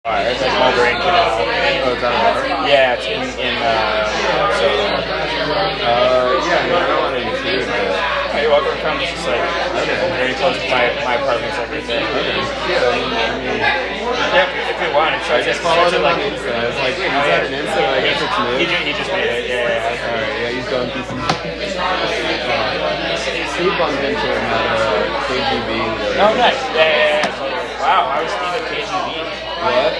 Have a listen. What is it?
Soundscapes > Indoors
people chatting in a bar in the us
accent, american, english, speak, talk, voice